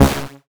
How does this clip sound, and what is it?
Instrument samples > Synths / Electronic
CINEMABASS 2 Db
Synthesized instrument samples